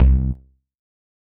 Instrument samples > Synths / Electronic
syntbas0024 C-kr
VSTi Elektrostudio (Model Mini) + 3xSynth1
bass, vst